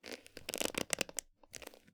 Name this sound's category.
Sound effects > Objects / House appliances